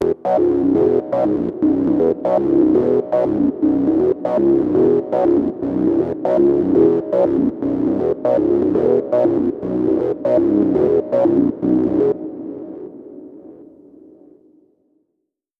Instrument samples > Synths / Electronic
Looking for a cutoff
Short clip in need of a cut off dial.!
atmosphere, electro, electronic, loop, music, synth